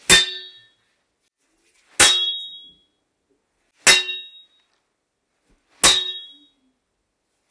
Sound effects > Other
Sword hit (low metal)
Four metal hits made with a sickle and a metal blade tool. The sound evokes medieval swords or other weapons, perfect for foley in a fantasy movie or for video games battle. This sound is a heavier metal version. There is a lighter one in the pack with a higher pitch. (Unfortunately) recorded in mono with an iPhone (because I had nothing else available), but under ideal conditions. And then processed with RX11 and a few plugin adjustements.